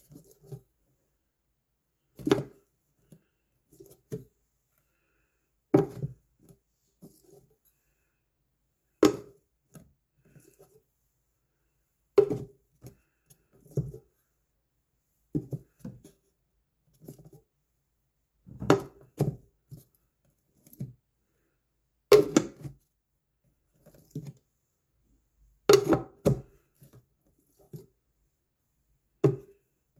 Sound effects > Objects / House appliances
OBJHsehld-Samsung Galaxy Smartphone, CU Paint Can, Lid, Open, Close Nicholas Judy TDC
A paint can lid open and close.
can, close, foley, lid, open, paint, paint-can, Phone-recording